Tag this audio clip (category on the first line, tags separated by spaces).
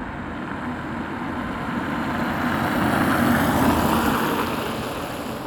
Sound effects > Vehicles
wet-road passing-by car asphalt-road studded-tires moderate-speed